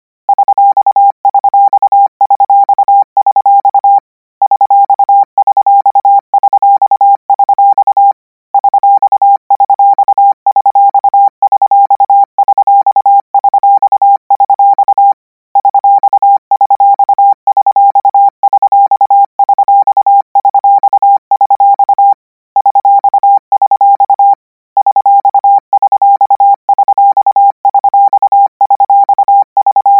Sound effects > Electronic / Design
Koch 46 $ - 200 N 25WPM 800Hz 90
Practice hear symbol '$' use Koch method (practice each letter, symbol, letter separate than combine), 200 word random length, 25 word/minute, 800 Hz, 90% volume.